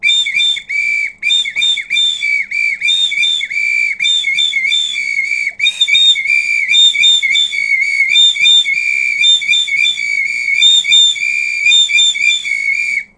Sound effects > Objects / House appliances
WHSTMech-Blue Snowball Microphone, CU Whistle, Samba, Rhythm Nicholas Judy TDC
A samba whistle rhythm.
Blue-brand, Blue-Snowball, brazil, rhythm, samba, whistle